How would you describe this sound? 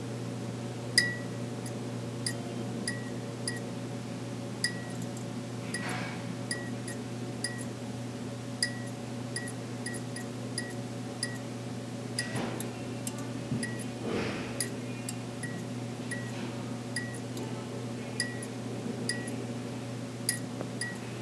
Sound effects > Other mechanisms, engines, machines
Lights Flickering and Distant Machinery
Lights flickering in hallway with distant thuds and machinery Sound is my own, recorded on an iPhone 12